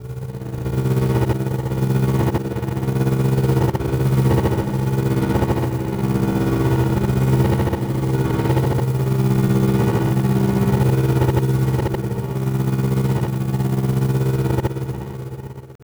Music > Other
Unpiano Sounds 000
Distorted, Distorted-Piano, Piano